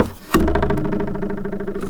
Sound effects > Other mechanisms, engines, machines
Handsaw Beam Plank Vibration Metal Foley 4
plank, vibe, saw, smack, twang, vibration, fx, hit, metallic, handsaw, foley, tool, metal, household, sfx, perc, percussion, shop, twangy